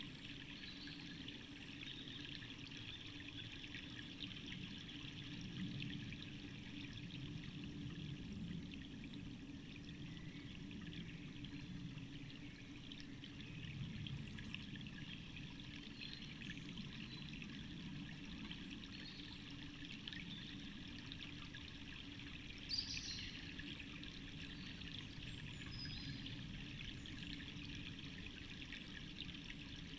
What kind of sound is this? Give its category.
Soundscapes > Nature